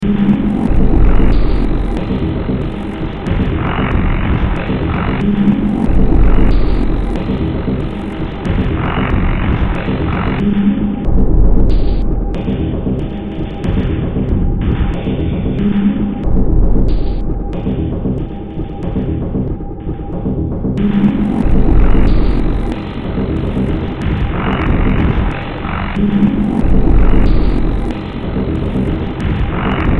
Music > Multiple instruments
Demo Track #2988 (Industraumatic)
Ambient, Games